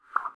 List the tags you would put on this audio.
Sound effects > Human sounds and actions
FX respawn Unit

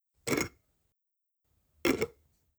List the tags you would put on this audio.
Sound effects > Objects / House appliances
closing opening glass open jar lid close

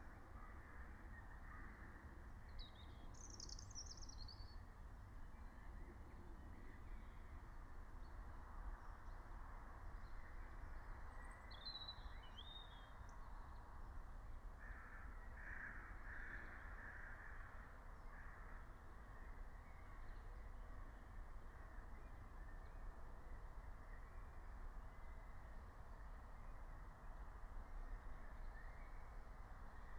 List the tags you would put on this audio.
Soundscapes > Nature
phenological-recording soundscape alice-holt-forest

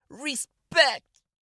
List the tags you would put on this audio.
Speech > Solo speech
France A2WS 20s mid-20s Voice-acting Male Surfer Tascam Dude Adult Single-mic-mono In-vehicle Cardioid English-language SM57 RAW one-shot Mono FR-AV2 August 2025 VA respect oneshot

